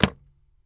Sound effects > Vehicles
Ford 115 T350 - Glovebox closing
T350, Single-mic-mono, Vehicle, SM57, 2003-model, Mono, 2025, Old, Ford, August, 115, A2WS, Van, France, 2003, Tascam, Ford-Transit, FR-AV2